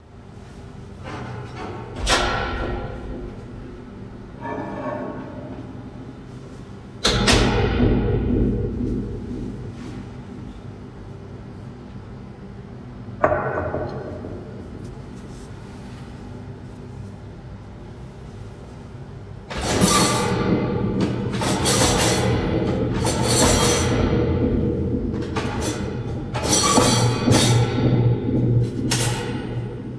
Sound effects > Objects / House appliances
noisy ghost
A series of loud scratching and crashing sounds with echo and reverb as if made by a noisy, restless ghost in a kitchen. Recorded with an iPhone and enhanced with BandLab. Originally a recording of me yanking open a microwave, taking out a dish, slamming the door, and setting the dish on the counter, followed by wrestling with a stuck utensil drawer and slamming it shut.
fx, noise, sound-effect